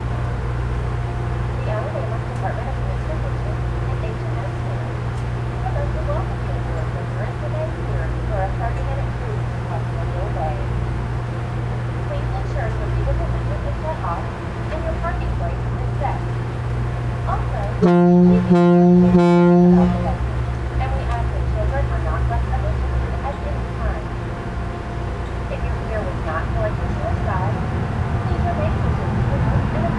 Soundscapes > Urban
Ferry between Dauphin Island and Fort Morgan, Alabama. Summer late afternoon, engines, passengers, seagulls, wind.